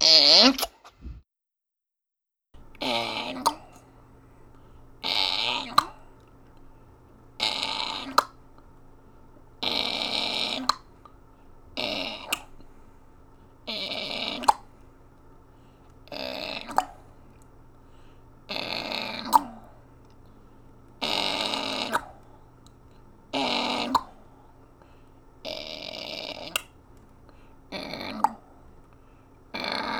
Sound effects > Objects / House appliances
TOONPop-Blue Snowball Microphone Cork, Big, Squeaks, Pops Nicholas Judy TDC
Big cork squeaks and pops.
big, Blue-brand, Blue-Snowball, cartoon, cork, pop, squeak